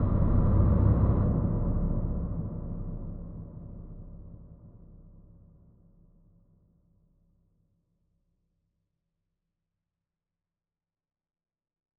Sound effects > Other
Deep Impact
Bad sh*t's happening somewhere below. Made with FL Studio.